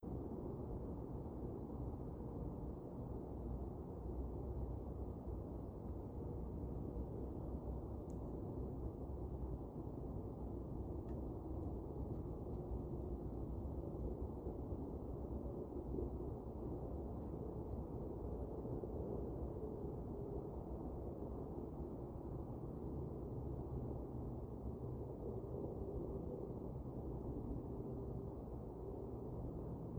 Soundscapes > Other
AMBAir-Samsung Galaxy Smartphone, MCU Quiet, Nighttime Nicholas Judy TDC
A quiet nighttime air ambience.
air, ambience, nighttime, Phone-recording, quiet